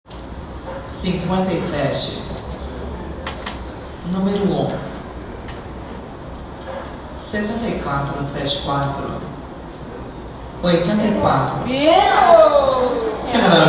Speech > Solo speech
Woman calling out numbers at a bingo game in portuguese.
woman-voice, bingo, numbers